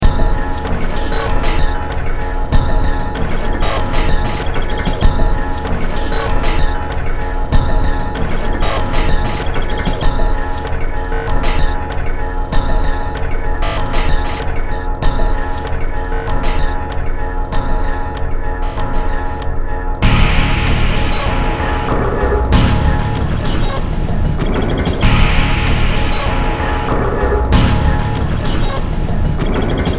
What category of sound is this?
Music > Multiple instruments